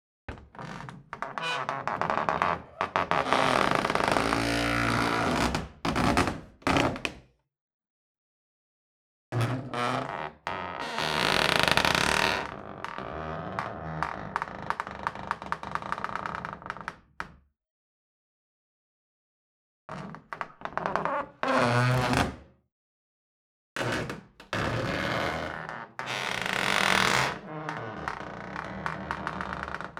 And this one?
Sound effects > Objects / House appliances
Old and noisy wardrobe
Sound of creaking a door of an old wooden wardrobe. Creaking, very powerful and eerie squeaks, with great personality! Recorded date: 21/06/2025 at 23:31 with: Zoom H1n with windscreen. Processing: denoising (Reaper FIR filter) and gate.
freesound20, italy, old, sfx, squeaking, wood